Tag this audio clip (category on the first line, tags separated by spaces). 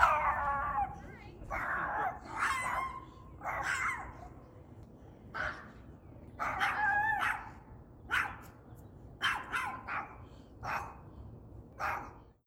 Sound effects > Animals
barking howling Phone-recording puppies rottweiler